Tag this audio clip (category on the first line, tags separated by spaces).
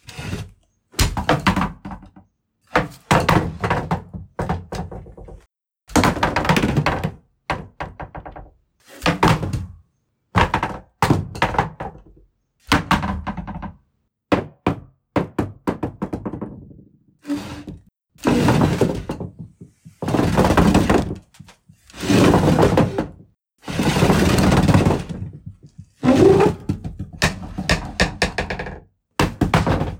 Sound effects > Other
disaster fall attic flooring shatter tragedy tragic pull mayhem throw wedge chaos catastrophe disorder crash clutter wood stock bazaar devastation loads furniture muddle havoc roll mess cargo jumble wreakage shipwreck